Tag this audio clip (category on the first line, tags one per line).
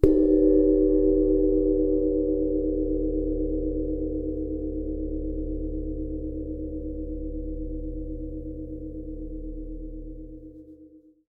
Music > Solo instrument
22inch
Ride
Cymbals
Oneshot
Perc
Drums
Custom
Cymbal
Kit
Percussion
Metal
Paiste
Drum